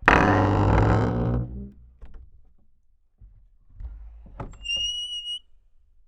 Sound effects > Objects / House appliances
Old cave door (Handheled) Mono - closing and opening top hinge 1
Subject : Door sounds opening/closing Date YMD : 2025 04 22 Location : Gergueil France Hardware : Tascam FR-AV2 and a Rode NT5 microphone. Weather : Processing : Trimmed and Normalized in Audacity. Maybe with a fade in and out? Should be in the metadata if there is.
closing, Dare2025-06A, Door, FR-AV2, hinge, indoor, NT5, opening, Rode, Tascam